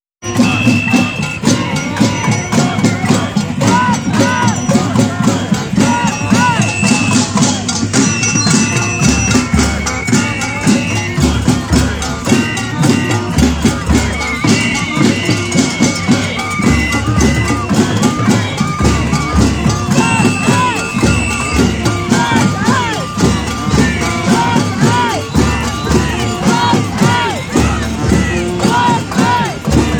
Soundscapes > Urban
protest sounds 09jan2026 minneapolis ice
2026 Anti ICE protest sounds recorded in downtown minneapolis on 09Jan2026, 2026-01-09 at 8:43 PM including a tuba, trumpet, sax, percussion drums, and various other instruments as well as chants, raw audio with a stock mastering plugin applied, explicit language, immigration protest, Minnesota Renee Good murder.
2026, drum, ice, immigration, out, protest, sax, tuba